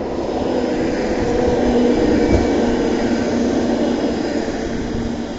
Soundscapes > Urban
Passing Tram 25
A sound of a tram passing by. The sound was recorded from Tampere, next to the tracks on the street. The sound was sampled using a phone, Redmi Note 10 Pro. It has been recorded for a course project about sound classification.